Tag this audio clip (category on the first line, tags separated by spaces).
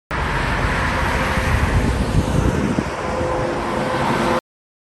Sound effects > Vehicles
car highway road